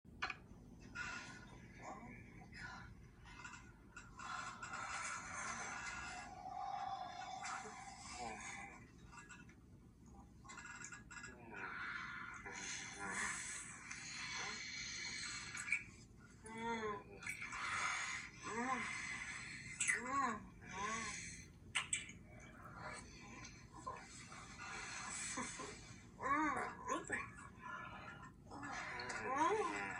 Sound effects > Other
SUPER HOT FUCK
Listening to the sound of this couple fornicating is music to my ears. Their lust for each other culminates with explosive orgasms.
dirty, moaning, erotic, fucking, kissing, bed